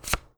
Sound effects > Objects / House appliances
GAMEMisc-Blue Snowball Microphone Card, Swipe from Other Hand 01 Nicholas Judy TDC
A card being swiped from another hand.